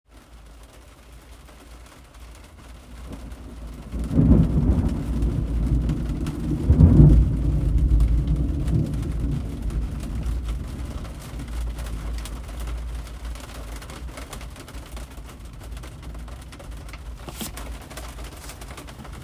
Sound effects > Natural elements and explosions
Medium Rain on Jeep Roof - Interior 2
Medium rain on roof of Jeep Wrangler, including some thunder. Interior recording.
vehicle, weather, raining, raindrops, rain